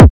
Instrument samples > Percussion
BrazilFunk Kick 21
Distorted, Kick, BrazilFunk, BrazilianFunk